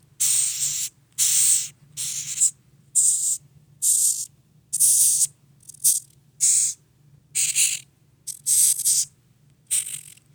Sound effects > Animals
Insects - Madagascar Hissing Cockroach, Several Hisses
A pet hissing roach makes its namesake when getting pet.